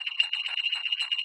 Sound effects > Objects / House appliances
Running along the rim of a glass mason jar with a metal screw, recorded with an AKG C414 XLII microphone.